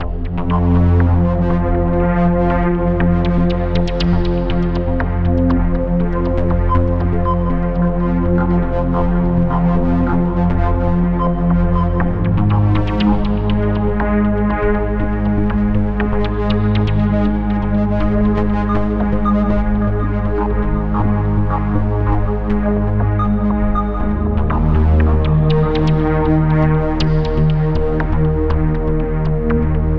Music > Multiple instruments
Game intro rider pq52
I was trying to go for an ambient track but this happen instead. Good for a video game intro stuff. There a game called Rider that has a similar song in the intro, then some slammin synthwave tracks. հիվանդագին շինել
Design, Arcade, Intro, Alien, Ambient, Gamesounds, Ost, Videogames